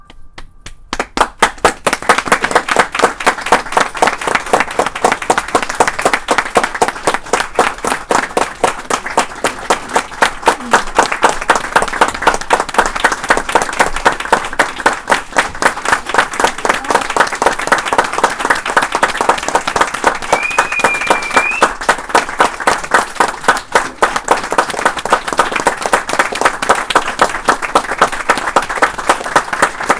Soundscapes > Indoors
Applause (Theatre Audience - Indoors)
Long applause. Crowd of about 60 people in a small, indoors, theatre venue (full-house). "#0:21 someone is whistling entousiastically". "#1:05 people are starting leaving their seats and move toward the exit while speaking in low voices and whispers". Recorded with Tascam DR-05X portable mini recorder.
hall, whispering, whistling, whistle, Crowd